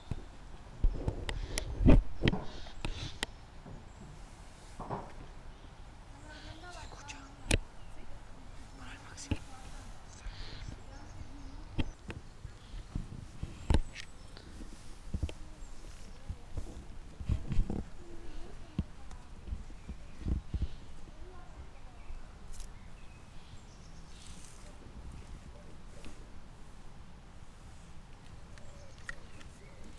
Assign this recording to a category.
Soundscapes > Nature